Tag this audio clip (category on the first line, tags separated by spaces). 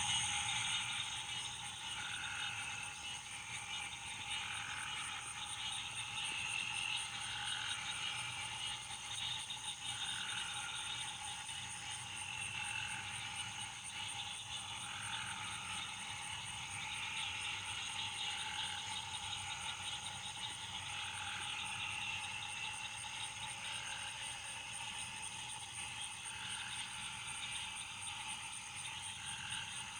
Soundscapes > Nature
swamp Wetland night Frog croak amphibian marsh